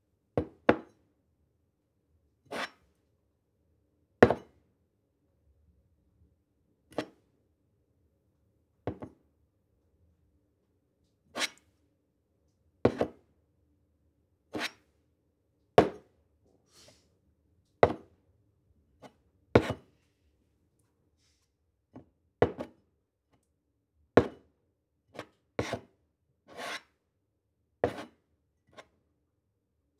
Sound effects > Objects / House appliances
0102 Grab and leave mug on desk table Agafar / Deixar tassa sobre escriptori Agarrar / Soltar taza en mesa de escritorio
Grab and leave mug on desk table. Multiple times. Agafar / Deixar tassa sobre escriptori. Diverses vegades. Agarrar / Soltar taza en mesa de escritorio. Varias veces. Recorded in mono with a Zoom H5 with an Audio Technica AT-897.
agarrar, coger, deixar, dejar, desk, despacho, despatx, escriptori, grab, mesa, table, taula